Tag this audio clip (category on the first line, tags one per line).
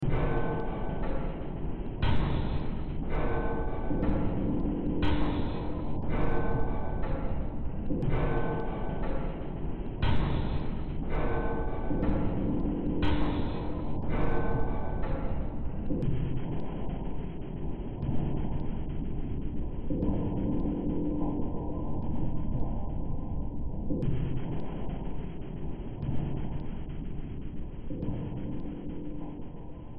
Music > Multiple instruments
Underground
Noise
Industrial
Games
Sci-fi